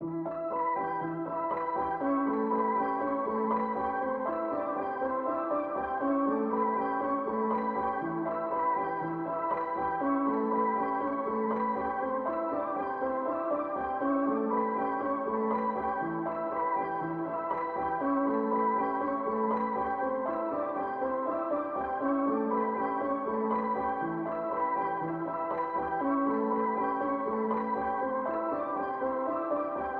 Music > Solo instrument
Piano loops 190 efect 2 octave long loop 120 bpm
120, 120bpm, free, loop, music, piano, pianomusic, reverb, samples, simple, simplesamples